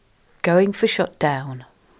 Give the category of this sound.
Speech > Solo speech